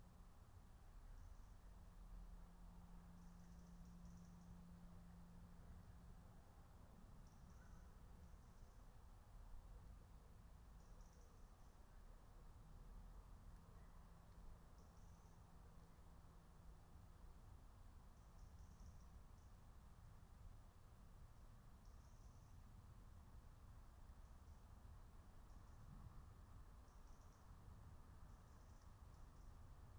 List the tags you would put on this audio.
Soundscapes > Nature
alice-holt-forest field-recording natural-soundscape nature phenological-recording

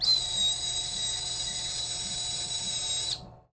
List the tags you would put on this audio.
Sound effects > Objects / House appliances
Blue-brand Blue-Snowball shepherd whistle

